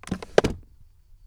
Sound effects > Vehicles
Ford 115 T350 - Glovebox
115, 2003, 2003-model, 2025, A2WS, August, Ford, Ford-Transit, France, FR-AV2, Mono, Old, Single-mic-mono, SM57, T350, Tascam, Van, Vehicle